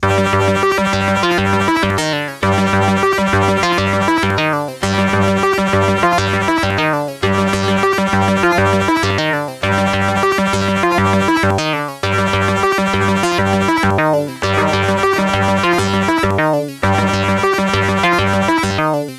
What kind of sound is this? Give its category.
Music > Solo instrument